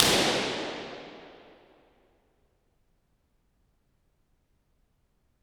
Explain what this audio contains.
Soundscapes > Other
I&R Esperaza's church - Mic at entrence Pop at Altar - ECM999
Subject : An Impulse and response (not just the response.) of Esperaza's church. Popping a balloon on the altar side of the church with the mic on the other side last seat row ish. Date YMD : 2025 July 12 Location : Espéraza 11260 Aude France. Recorded with a Superlux ECM 999 Weather : Processing : Trimmed in Audacity. Notes : Recorded with both a Superlux ECM 999 and a Soundman OKM-1 Tips : More info in the metadata, such as room size, height of pop and mic.
Response, Esperaza, balloon, omni, pop, Superlux, FRAV2, Reverb, Tascam, Impulseandresponse, FR-AV2, other-side, Impulse, 11260, church, Convolution-reverb, ballon, IR, convolution, ECM999